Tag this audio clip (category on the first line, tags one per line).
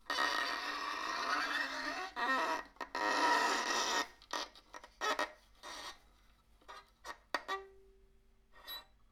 Instrument samples > String
beatup
bow
broken
creepy
horror
strings
uncomfortable
unsettling
violin